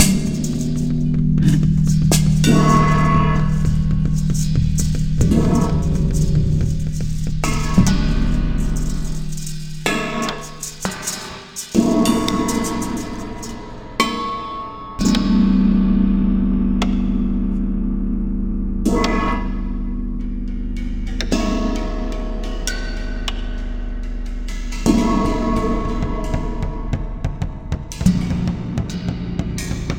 Instrument samples > Percussion
Deep Drum Kit Chaos Conglomeration
A conglomeration of drumkit sounds from a custom Sonor Force 3007 Kit with Sabian, Paiste, and Zildjian cymbals. Chaotic, Brooding, and atmospheric jazzy tones. Recorded in my studio with a Tascam D-05 and processed with Reaper, Izotope, and Fabfilter
abstract, atonal, Beat, chaos, conglomeration, Crash, Custom, Cym, Cymbal, Cymbals, Deep, Drum, Drumkit, Drums, experimental, FX, Gong, Hat, Hihat, jazz, Kick, low, Perc, Percussion, Percussive, Rhythm, Ride, Tom, Toms, Vintage